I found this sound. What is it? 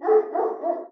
Sound effects > Animals
A recording of a dog barking in a residential area. Edited in RX11.